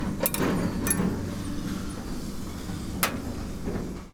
Sound effects > Objects / House appliances
Junkyard Foley and FX Percs (Metal, Clanks, Scrapes, Bangs, Scrap, and Machines) 93
Ambience, Atmosphere, Bang, Bash, Clang, Clank, Dump, dumping, dumpster, FX, garbage, Junk, Junkyard, Machine, Metal, Metallic, Perc, Percussion, rattle, Robotic, scrape, Smash, trash, tube, waste